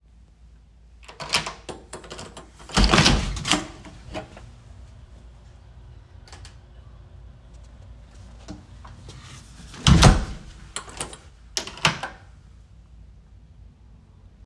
Sound effects > Objects / House appliances
House Front Door & Locks
Front door of a house being unlocked and opened from inside, and then shut and locked again.
front-door, household